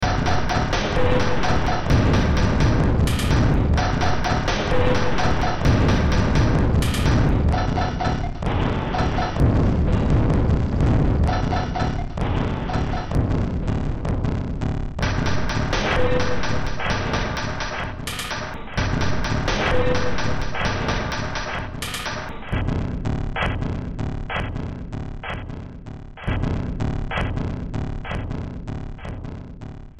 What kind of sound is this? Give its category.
Music > Multiple instruments